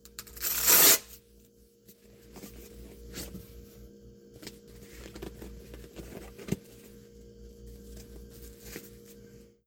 Sound effects > Objects / House appliances
EQUIPSprt-Samsung Galaxy Smartphone, CU Boxing Glove, Take Off, Put On, Velcro Rip Nicholas Judy TDC
A boxing glove taking off and putting on. Velcro rip.